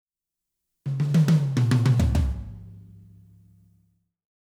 Music > Solo percussion
105 BpM - Tom Fill 80's - 02
105bpm, 80s, acoustic, drum-fill, drum-loop, drumloop, Drums, Fill, fill-in, indie, loop, natural-sound, pop, retro, rock, roomy, toms